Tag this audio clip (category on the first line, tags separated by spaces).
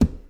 Sound effects > Objects / House appliances
bucket carry clang clatter cleaning container debris drop fill foley garden handle hollow household kitchen knock lid liquid metal object pail plastic pour scoop shake slam spill tip tool water